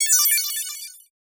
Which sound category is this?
Sound effects > Electronic / Design